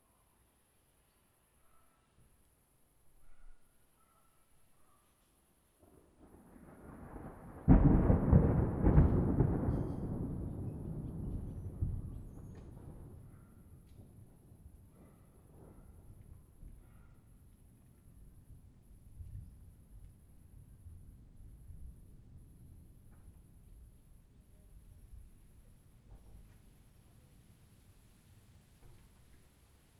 Soundscapes > Nature
This distant thunder sound was recorded before a moderate thunderstorm arrived. Recording made with Zoom H4n.
thunder, mountain, nature, field-recording, storm